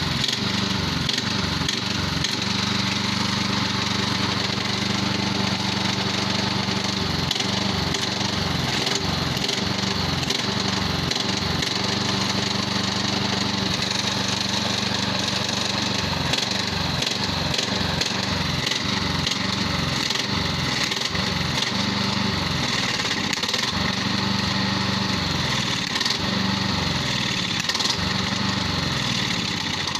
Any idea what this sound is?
Sound effects > Other mechanisms, engines, machines
oilburner dies lq
Audio exported from a video i took of the Quantum 65 mower running and in the end, finally giving out. The silent pauses are me removing my voice from the audio file (to protect my identity, and stuff). Recorded with my phone, but NOT through Dolby On therefore the lower quality.
fourstroke, engine, motor, rodknock, four-stroke, mower, engine-failure